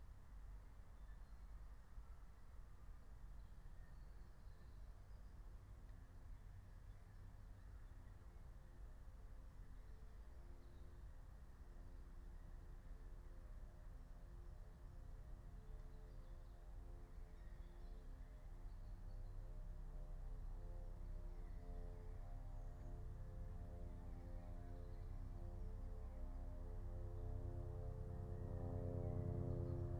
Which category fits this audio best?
Soundscapes > Nature